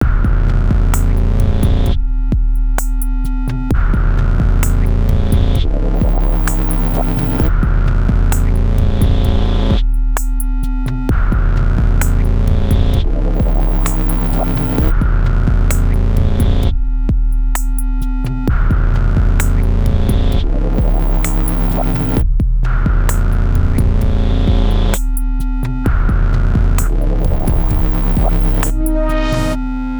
Music > Multiple instruments
Dubby Dark Bass Beat 130bpm
a dark dubby bassy beat , created from my sub bass glitch samples in FL Studio
130bpm, bass, bassy, beat, club, dance, dark, drum, drums, dub, dubstep, electro, glitch, glitchy, industrial, kit, loop, loopable, low, lowend, rave, rhythm, sci-fi